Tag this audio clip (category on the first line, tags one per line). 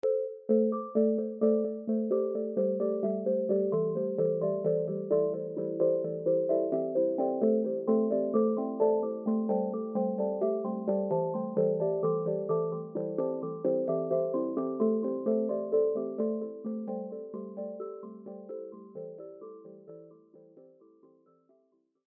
Music > Other
cellphone
iphone
phone
ringtone